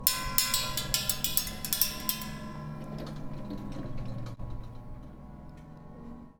Sound effects > Objects / House appliances
Junkyard Foley and FX Percs (Metal, Clanks, Scrapes, Bangs, Scrap, and Machines) 133
Clang, garbage, rubbish, Atmosphere, FX, dumpster, Percussion, Machine, rattle, Robotic, SFX, Perc, scrape, Metallic, Bang, Junk, dumping, Ambience, trash, tube, Robot, Smash, Dump, Clank, Bash, waste, Environment, Metal, Foley, Junkyard